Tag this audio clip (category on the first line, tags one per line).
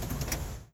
Sound effects > Vehicles
set
parking-brake
release
Phone-recording
foley
slow